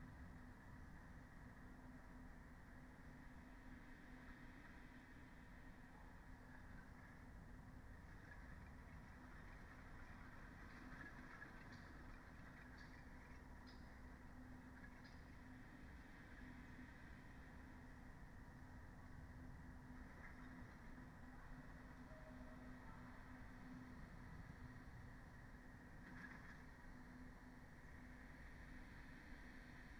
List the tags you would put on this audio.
Soundscapes > Nature
field-recording
Dendrophone
weather-data
data-to-sound
soundscape
artistic-intervention
alice-holt-forest
sound-installation
natural-soundscape
nature
raspberry-pi
phenological-recording
modified-soundscape

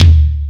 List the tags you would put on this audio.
Instrument samples > Percussion
death-metal
drum
drumset
Tama
unsnared

